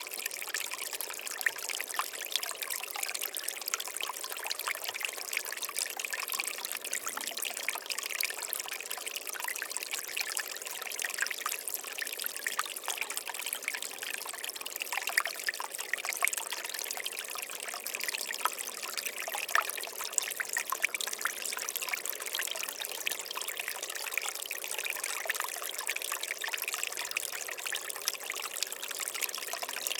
Soundscapes > Nature
Medium Stream Tiny Marbles
river, trickle, waterstream